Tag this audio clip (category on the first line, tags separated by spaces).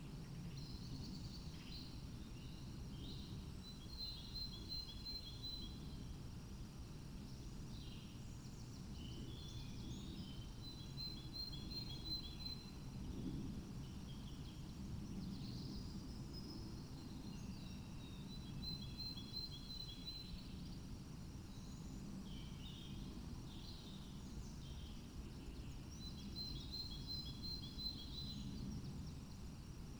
Soundscapes > Nature
data-to-sound sound-installation phenological-recording nature field-recording weather-data modified-soundscape Dendrophone raspberry-pi natural-soundscape alice-holt-forest soundscape artistic-intervention